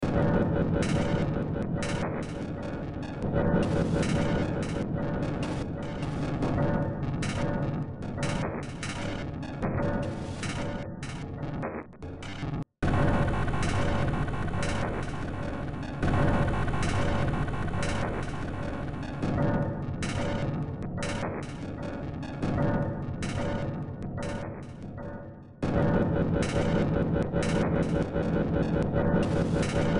Music > Multiple instruments
Short Track #3803 (Industraumatic)
Games, Horror, Industrial, Soundtrack, Cyberpunk, Ambient, Underground